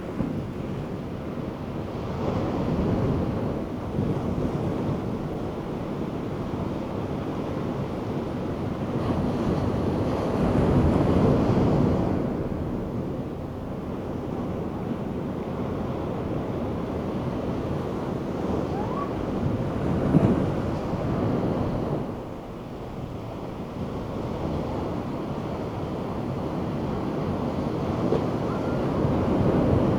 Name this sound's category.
Soundscapes > Nature